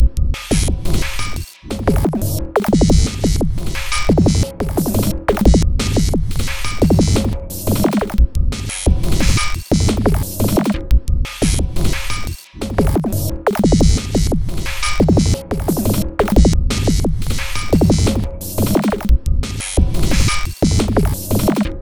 Instrument samples > Percussion
This 88bpm Drum Loop is good for composing Industrial/Electronic/Ambient songs or using as soundtrack to a sci-fi/suspense/horror indie game or short film.
Ambient, Industrial, Alien, Loop, Soundtrack, Loopable, Drum, Samples